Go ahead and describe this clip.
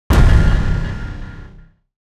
Sound effects > Other
Sound Design Elements Impact SFX PS 069
percussive, smash, blunt, audio, strike, hard, explosion, sfx, collision, design, heavy, force, transient, thudbang, sound, crash, power, hit, rumble, cinematic, impact, shockwave, game, sharp, effects